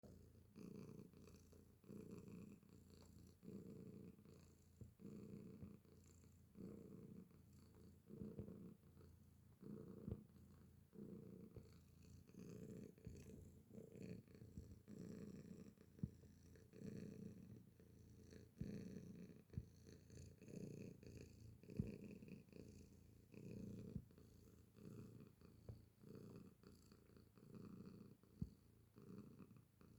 Sound effects > Animals
Purring Tomcat 2
Cute relaxing purring sound from a big tomcat
cat-sounds, purring